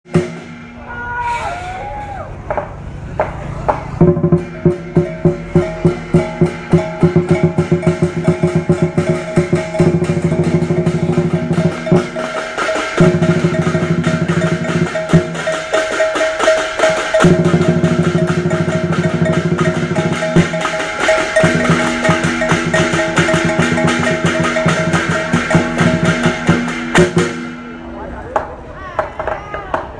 Music > Multiple instruments
Múa Lân 3 - 麒麟 Qí Lín 3
Musica for kỳ lân dance (麒麟 qí lín). Record use iPhone 7 smart phone. 2025.02.01 17:38
dance, music, qi-lin